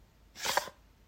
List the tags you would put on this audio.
Sound effects > Objects / House appliances
ramrod pistol slide

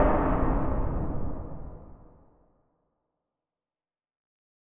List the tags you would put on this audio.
Sound effects > Other
impact percussion cinematic synth

